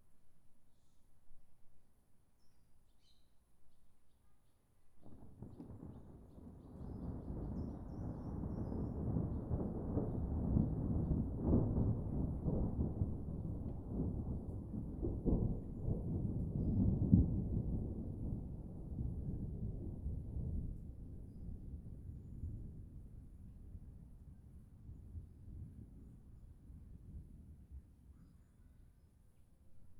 Soundscapes > Nature
thunder2 PDA
This recording features the rumble of distant thunder lasting several seconds, accompanied by light, barely perceptible rain. Recorder: Zoom H4n, August 2025.